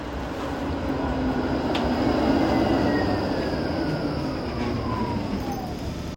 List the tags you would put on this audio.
Sound effects > Vehicles
Tampere tram field-recording

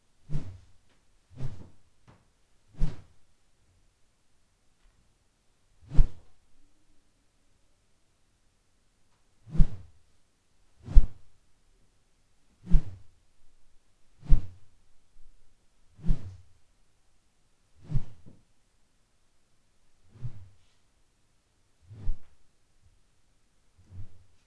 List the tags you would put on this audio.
Sound effects > Electronic / Design
multi-take to-be-edited OKM1 whoosh plastic Soundman whooshes Woosh SFX Tascam swipe FR-AV2 raw broom-stick stick transition broom

